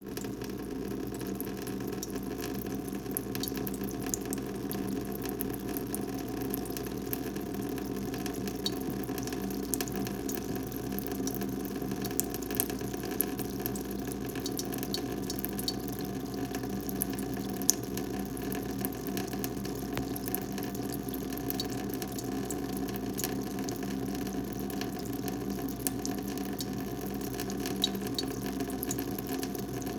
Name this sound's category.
Sound effects > Objects / House appliances